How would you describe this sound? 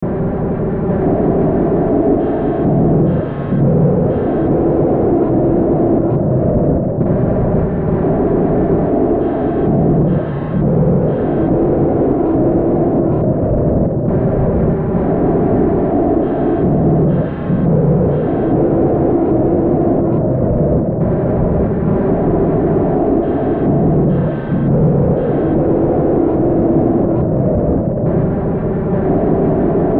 Soundscapes > Synthetic / Artificial
Looppelganger #179 | Dark Ambient Sound
Use this as background to some creepy or horror content.
Ambience, Ambient, Darkness, Drone, Games, Gothic, Hill, Horror, Noise, Sci-fi, Silent, Soundtrack, Survival, Underground, Weird